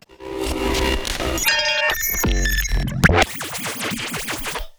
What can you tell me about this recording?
Sound effects > Experimental
Glitch Percs 3 conglomeration
perc, alien, sfx, edm, snap, zap, glitchy, glitch, fx, idm, pop, crack, hiphop, otherworldy